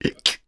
Speech > Solo speech
Hurt - hrk
FR-AV2, Human, Hurt, Male, Man, Mid-20s, Neumann, NPC, oneshot, pain, random, singletake, Single-take, talk, Tascam, U67, Video-game, Vocal, voice, Voice-acting, weird